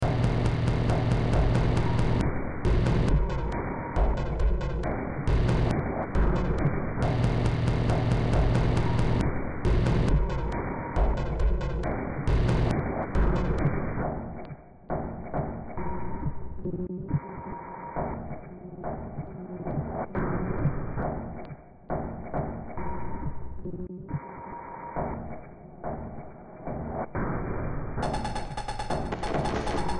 Music > Multiple instruments
Demo Track #3027 (Industraumatic)
Industrial, Games, Underground, Cyberpunk, Ambient, Sci-fi, Horror, Noise, Soundtrack